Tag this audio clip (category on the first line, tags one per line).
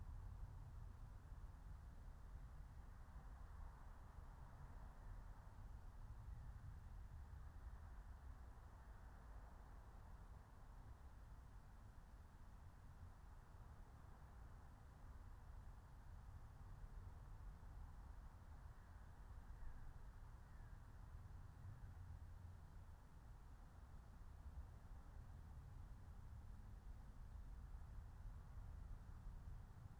Soundscapes > Nature
field-recording
alice-holt-forest
natural-soundscape
soundscape
raspberry-pi
phenological-recording
meadow
nature